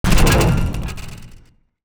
Sound effects > Other
Sound Design Elements Impact SFX PS 075
Effects recorded from the field.